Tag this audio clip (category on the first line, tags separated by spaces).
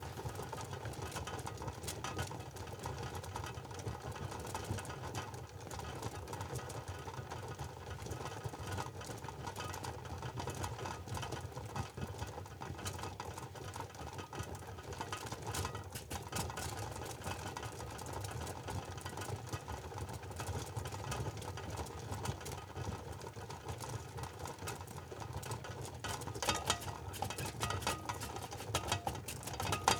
Sound effects > Objects / House appliances
bubble hiss hot ringing Soup steam